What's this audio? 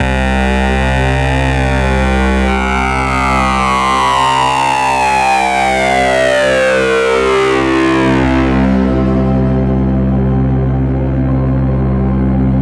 Music > Solo instrument
Synth Pad Loop made using Jen Synthetone SX1000 analog synth